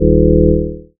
Instrument samples > Synths / Electronic
WHYBASS 1 Eb
additive-synthesis, bass, fm-synthesis